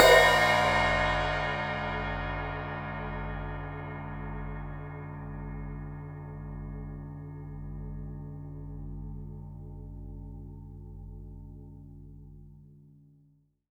Music > Solo instrument
Zildjian 16 inch Crash-001
16inch, Crash, Custom, Cymbal, Cymbals, Drum, Drums, Kit, Metal, Oneshot, Perc, Percussion, Zildjian